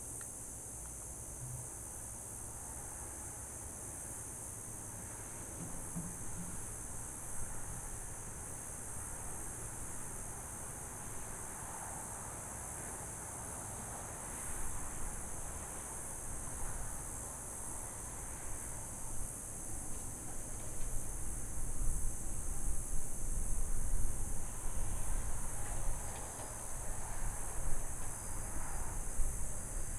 Soundscapes > Urban
AMBSea-Summer Grassland Boardwalk Park, crickets, distant traffic, 5AM QCF Gulf Shores Alabama Zoom H3VR
Sawgrass estuary leading into Lake Shelby, Gulf Shores, Alabama, 5AM. Boardwalk above the water, crickets, frogs, distant early morning traffic.
swamp, traffic, crickets, field-recording, morning, sawgrass, estuary